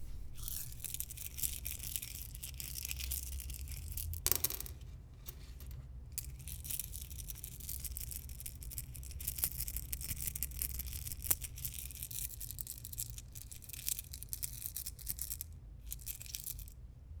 Sound effects > Objects / House appliances
moving nuts and bolts in hand
Shaking and rattling a bunch of nuts and bolts in a single hand. Recorded with Zoom H2.
screw, rattle, nut